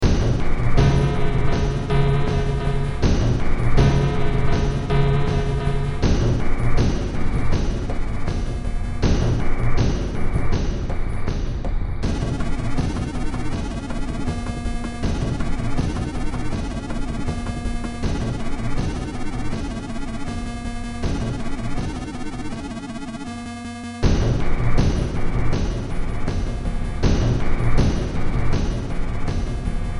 Music > Multiple instruments
Short Track #3651 (Industraumatic)
Ambient, Cyberpunk, Games, Horror, Industrial, Noise, Sci-fi, Soundtrack, Underground